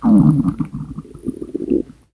Sound effects > Human sounds and actions
A stomach growling.